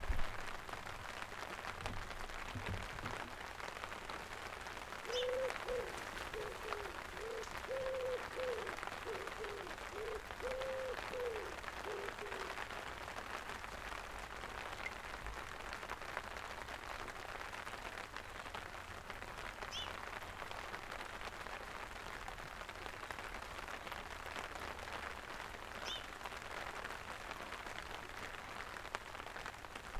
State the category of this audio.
Soundscapes > Nature